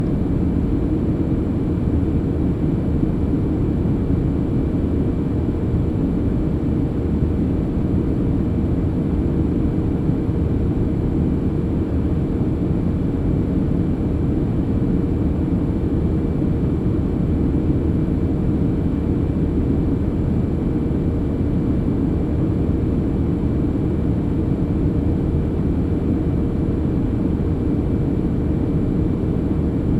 Soundscapes > Synthetic / Artificial
Starship Background Strong Engines
A great background piece for an active starship, one engaged in some combat excercises or is traveling at a magnificent velocity through the universe. Made using the fan sounds from the cooling pad for this very laptop.
starship, scifi, background, ambience, science, fiction